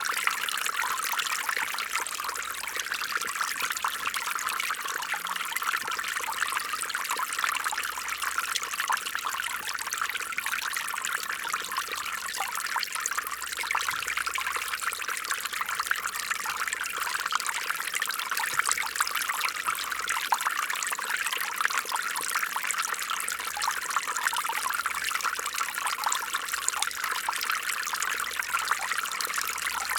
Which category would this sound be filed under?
Soundscapes > Nature